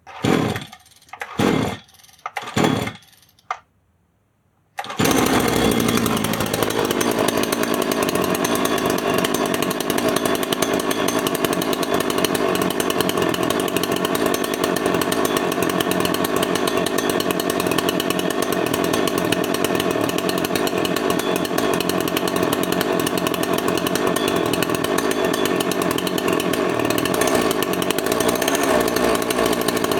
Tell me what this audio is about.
Sound effects > Other mechanisms, engines, machines
Worn-out two-stroke chainsaw starting, idling and free-revving. (HQ VERSION)
An old-ish two-stroke chainsaw starting, free-revving, idling, etc. The sound came out bad in my opinion, i did not realise the muffler was pointing at the microphone and so each combustion caused audio dropouts. I can not re-record the saw as while troubleshooting it after recording the connecting rod gave out. This is the processed, HQ version. There is also an unprocessed version.
chainsaw, engine, idle, motor, rev, saw, start, two-cycle, two-stroke, twostroke